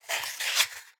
Sound effects > Objects / House appliances
Matchsticks Strike 1 Riser
Striking a matchstick, recorded with an AKG C414 XLII microphone.